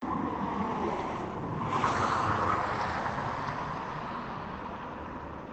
Sound effects > Vehicles
car passing distant2
Car traveling at approx. 60+ km/h passing by on a wet paved highway at approx. 30 meters away. Recorded in an urban setting in a near-zero temperature, using the default device microphone of a Samsung Galaxy S20+.
driving, car, highway